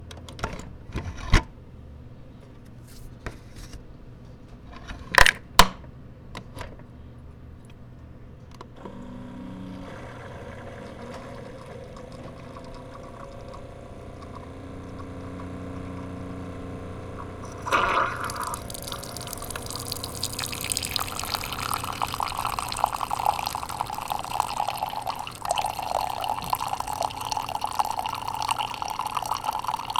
Sound effects > Objects / House appliances
Making Coffee from a Kurig Coffee Maker
Recording was near the front of the machine.
kitchen, squirt